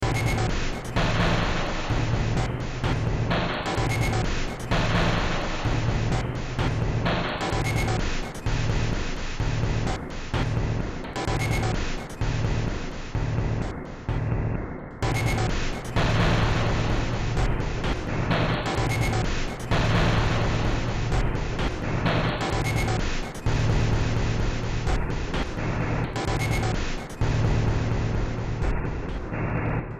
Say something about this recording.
Music > Multiple instruments
Demo Track #3450 (Industraumatic)
Ambient, Horror, Sci-fi, Noise, Soundtrack, Games, Underground, Industrial, Cyberpunk